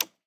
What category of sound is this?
Sound effects > Human sounds and actions